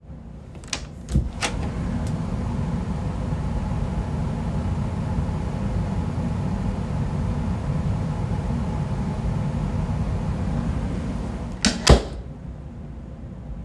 Sound effects > Objects / House appliances
HVAC Closet Door Open and Close
An HVAC closet door is opened, revealing the loud sound of the system running, and then the door is closed.